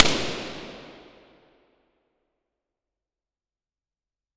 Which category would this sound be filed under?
Soundscapes > Other